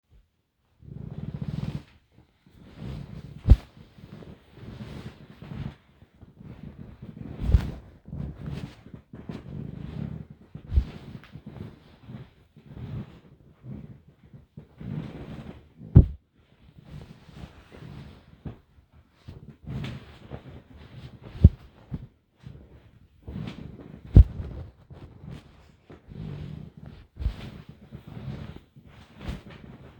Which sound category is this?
Sound effects > Objects / House appliances